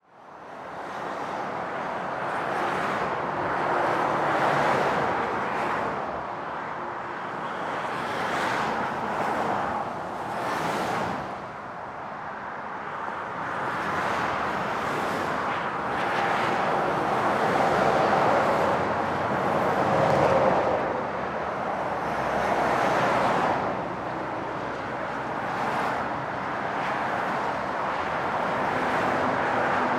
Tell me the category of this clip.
Soundscapes > Other